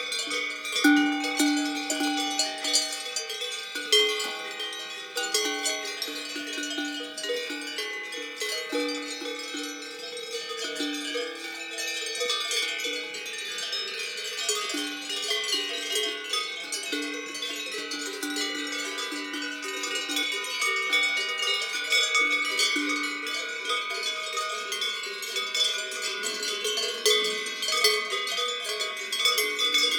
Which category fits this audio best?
Sound effects > Animals